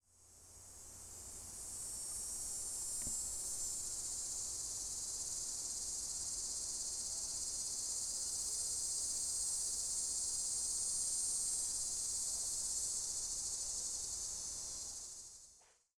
Soundscapes > Nature
Cicadas Close 2
Field Recording of some close cicadas